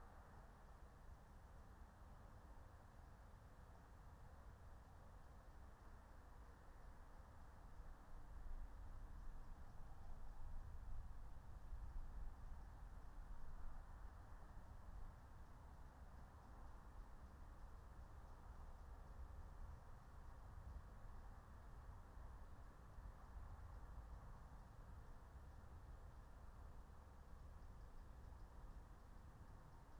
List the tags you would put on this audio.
Soundscapes > Nature
nature raspberry-pi meadow alice-holt-forest field-recording natural-soundscape soundscape phenological-recording

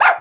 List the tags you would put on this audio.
Sound effects > Animals
smol bark animal small doggo Shih-Tzu dog-sound medium-distance woof doggie barking dog

